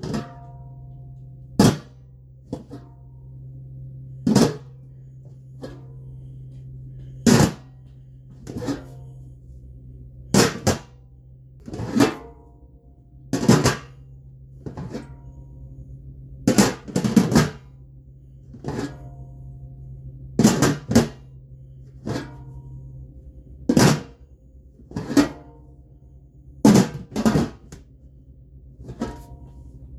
Sound effects > Objects / House appliances
METLHndl-Samsung Galaxy Smartphone, CU Big Metal Pot Lid, Open, Close Nicholas Judy TDC
A big metal pot lid opening and closing.
metal, big, Phone-recording, lid, open, pot, close, foley